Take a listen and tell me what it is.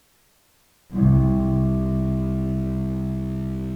Instrument samples > Synths / Electronic
Picked Bass Long
1shot, Bass, garage, Grime, hiphop, jungle, Long, oneshot, Picked, trap